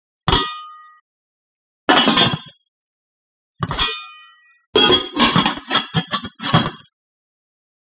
Sound effects > Objects / House appliances
pot-lid
A pot lid being placed or lifted, creating a metal tap or clink. Recorded with Zoom H6 and SGH-6 Shotgun mic capsule.